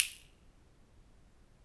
Sound effects > Other
Albi bedroom impulse and response 3 (Fingersnap middle of bedroom)
Subject : Trying to record the ugly reverb of my room. Date YMD : 2025 June Location : Albi 81000 Tarn Occitanie France indoor. Hardware : Tascam FR-AV2 and a Superlux ECM-999 Weather : Processing : Trimmed in Audacity. Notes : Never did / used a impulse response so hope this is good enough. Tips : Saying "impulse AND response" not just the response. You might want to trim off the impulse.
bedroom, FR-AV2, unpleasent, impulse-response, Tascam, ugly, home, snap, Impulse, finger-snap, ECM999, ECM-999, Superlux, finger, reponse